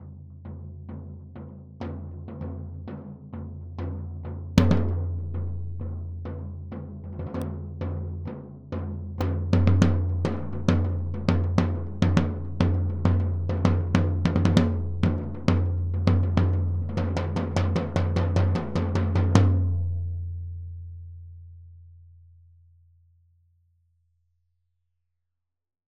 Music > Solo percussion
floor tom- loose beat 1 - 16 by 16 inch
beat, beatloop, beats, drum, drumkit, drums, fill, flam, floortom, instrument, kit, oneshot, perc, percs, percussion, rim, rimshot, roll, studio, tom, tomdrum, toms, velocity